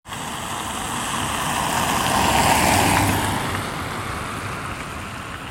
Sound effects > Vehicles
car rain 07
A recording of a car passing by on Insinöörinkatu 30 in the Hervanta area of Tampere. It was collected on November 7th in the afternoon using iPhone 11. There was light rain and the ground was slightly wet. The sound includes the car engine and the noise from the tires on the wet road.
car, engine, rain, vehicle